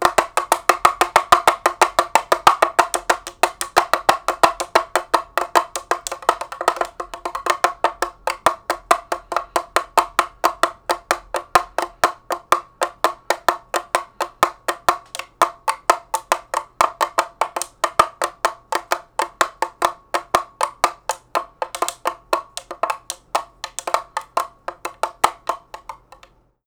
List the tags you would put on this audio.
Music > Solo percussion
Blue-brand
Blue-Snowball
drums
monkey
spinning

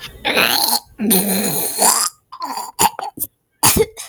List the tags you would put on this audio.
Speech > Solo speech
vomiting
voice
idk

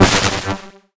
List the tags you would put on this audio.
Instrument samples > Synths / Electronic
additive-synthesis
bass

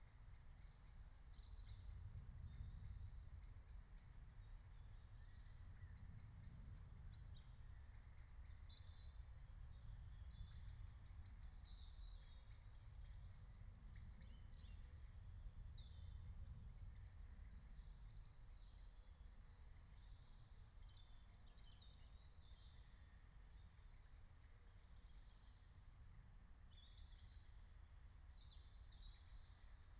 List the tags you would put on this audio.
Soundscapes > Nature
field-recording
meadow
raspberry-pi
phenological-recording
soundscape

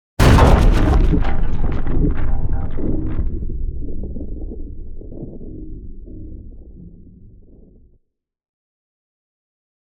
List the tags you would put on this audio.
Sound effects > Other
rumble
percussive
sharp
audio
hard
effects
power
transient
sound
smash
crash
shockwave
heavy
game
impact
hit
sfx
strike
blunt
thudbang
explosion
collision
cinematic
force
design

